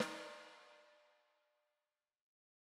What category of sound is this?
Music > Solo percussion